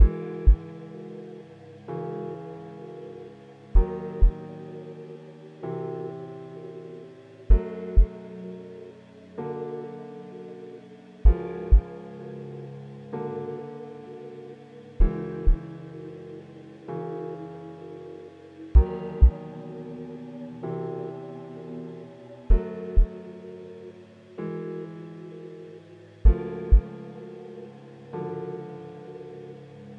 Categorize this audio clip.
Music > Multiple instruments